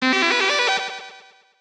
Sound effects > Electronic / Design
microtonal synth
made with openMPT and plogue chipcrusher